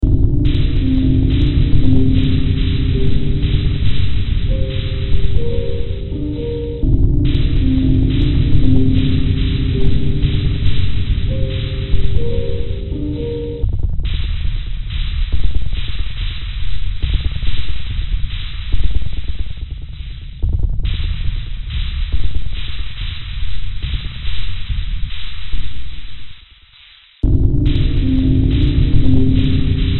Music > Multiple instruments
Demo Track #4050 (Industraumatic)
Soundtrack, Underground, Ambient, Games, Cyberpunk, Horror, Industrial, Noise, Sci-fi